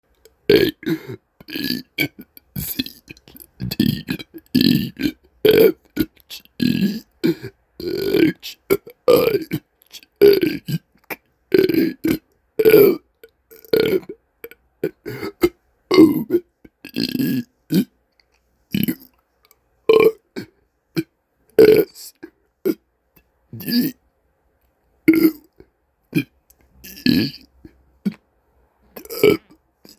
Speech > Other
A gross rendition of the alphabet. I don't know who needs this, but here it is - the burped alphabet. Every letter from A to Z in an inglorious display. Fully performed by me for your displeasure.